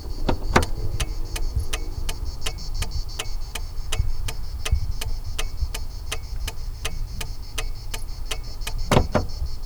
Sound effects > Vehicles
Ford 115 T350 - Warning button
115, 2003, 2003-model, 2025, A2WS, August, Ford, Ford-Transit, France, FR-AV2, Mono, Old, Single-mic-mono, SM57, T350, Tascam, Van, Vehicle